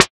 Instrument samples > Synths / Electronic
SLAPMETAL 8 Gb
additive-synthesis, fm-synthesis, bass